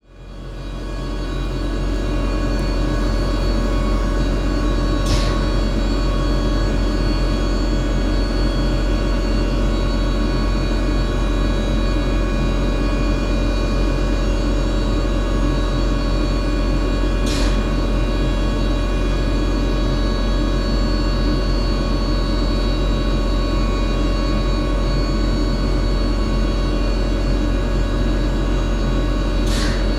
Soundscapes > Urban
A recording of the outside of a factory on a road.
recording, ambience